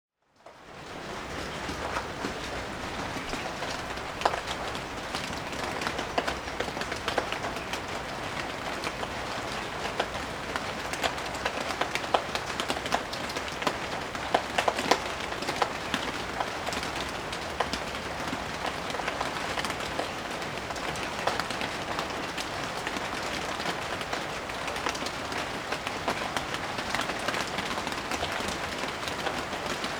Soundscapes > Other
Rain under Roof ZoomF3 RodeNTG5 32bitFloat 250423 003 Tr2
Recording of Rain that drops on a plastic foil - very distinctive noise. Record under a glass roof. Zoom F3 Rode NTG5